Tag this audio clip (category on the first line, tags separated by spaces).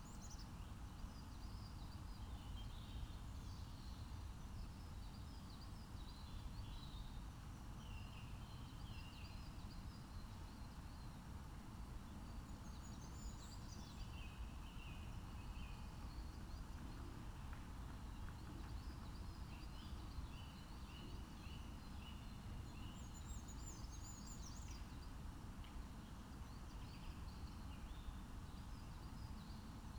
Soundscapes > Nature
field-recording natural-soundscape phenological-recording raspberry-pi soundscape